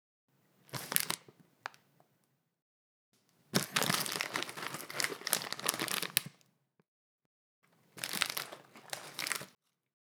Sound effects > Other
FOODEat Cinematis RandomFoleyVol2 CrunchyBites Food.Bag Bruschetta Rustle Several Freebie
This is one of the several freebie items of my Random Foley | Vol.2 | Crunchy Bites pack.